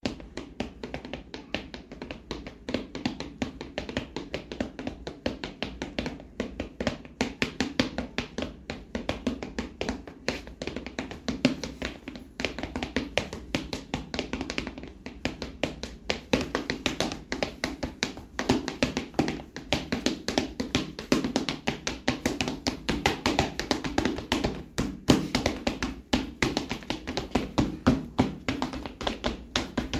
Sound effects > Human sounds and actions
FEETHmn-Samsung Galaxy Smartphone, CU Tap Dancing Nicholas Judy TDC
Tap dancing. Recorded at Johnston Willis Hospital.
cartoon, foley, footsteps, Phone-recording, tap-dance